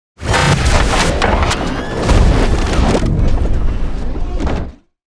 Sound effects > Other mechanisms, engines, machines
Mechanical Sound Design Elements-Robot PS 012
Mechanical Sound Design Elements-Robot SFX ,is perfect for cinematic uses,video games. Effects recorded from the field.
creaking, steampunk, door, crackle, beeping, engine, grinds, futuristic, clicking, metal, ringing, rumble, strokes, vibrations, robot, industrial, buzz, machine, mechanical, horror, trembling